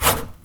Sound effects > Other mechanisms, engines, machines
Handsaw Oneshot Hit Stab Metal Foley 11
vibe, percussion, metal, tool, handsaw, vibration, metallic, smack, hit, saw, household, plank, twangy, fx, perc, foley